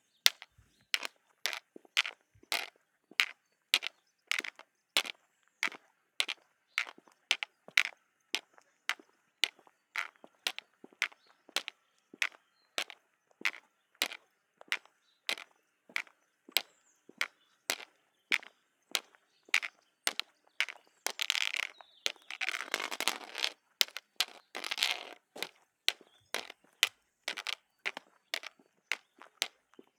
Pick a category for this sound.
Sound effects > Human sounds and actions